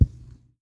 Sound effects > Other
ANMLCat Cat Tail Hits on Furniture, Light Pat Nicholas Judy TDC
A cat's tail hits on furniture with a light pat.